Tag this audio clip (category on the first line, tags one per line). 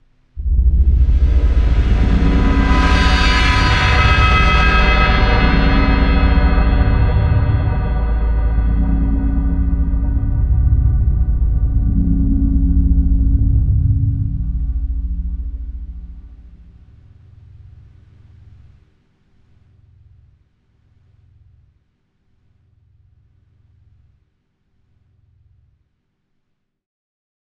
Sound effects > Experimental
alien
ambience
astrophysics
aura
bass
bizarre
chilling
crash
cryptic
cymbal
eerie
exoalien
exoaliens
exoplanet
exoplanets
frightening
ghostly
haunting
ominous
resonance
reverse
spectral
strange
surreal
UFO
uncanny
unearthly
unsettling
weird